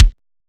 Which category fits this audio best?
Instrument samples > Percussion